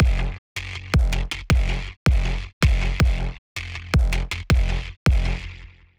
Music > Multiple instruments
just a heavily distorted serum bass with a kick triggering a sidechain